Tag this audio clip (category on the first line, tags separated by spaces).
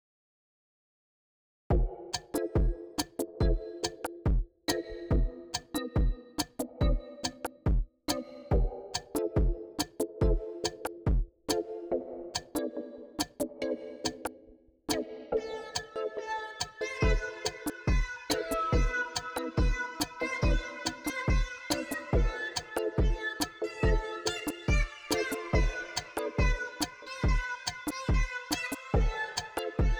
Music > Multiple instruments
drums music